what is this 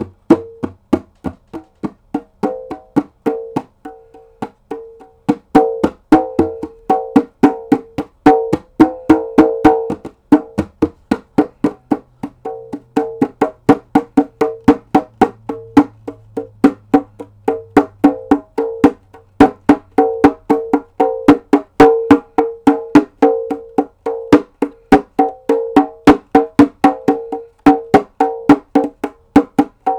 Music > Solo percussion
MUSCPerc-Blue Snowball Microphone, CU Djembe, Slow African Jungle Music Nicholas Judy TDC
Slow african jungle music being played on a djembe.